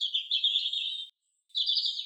Sound effects > Animals
A recording of a robin. Edited using RX11.
nature, robin